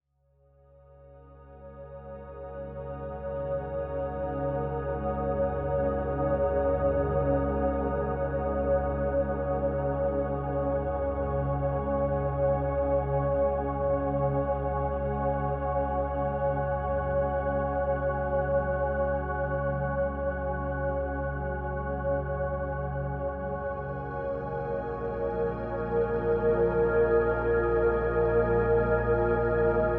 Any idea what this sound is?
Soundscapes > Synthetic / Artificial
Pad,Ambient,Meditation - Submersion
Ambient, Meditation, Drift away with beautiful, sad pads. It can be ideally used in your projects. Not used ai-generated. 120 Bpm Thank you!
chill, relax, sad, music, space, pad, deep, noise, ambience, soundscape, atmospheric, calm, drone, dark, sci-fi, experimental, atmosphere, ambient, electronic, emotional, synth